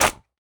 Sound effects > Objects / House appliances
33cl Cola can Crush - DJI-MIC3
Subject : A 33cl tall sodacan being crushed by foot on a plank of wood. In a basement. Date YMD : 2025 October 31 Location : Albi Indoor. Hardware : Two Dji Mic 3 hard panned. One close on the floor, another an arm's length away abour 30cm high. Weather : Processing : Trimmed and normalised in Audacity. Fade in/out Notes : Tips : Saying "Dual mono" and "synced-mono" in the tags, as the two mics weren't really intended to give a stereo image, just two positions for different timbres.
synced-mono, soda-can, 33cl, cola, dual-mono, can, on-wood, Dji, DJI-mic3, empty, tin, crushed